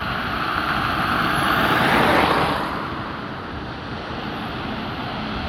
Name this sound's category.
Soundscapes > Urban